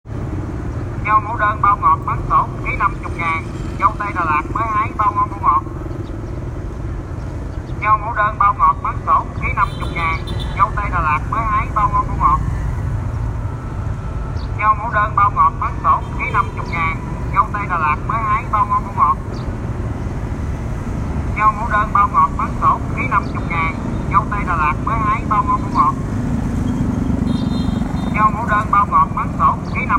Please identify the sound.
Speech > Solo speech
Giao Mẫu Đơn Bao Ngọt Bán Xổ.
Man sell strawberry say 'Giao mẫu đơn bao ngọt, bán xổ. Một ký 50 ngàn. Dâu tây Đà Lạt mới hái, bao ngon bao ngọt'. Record use iPhone 7 Plus 2025.01.03 17:12
business, male, man, sell, strawberry, voice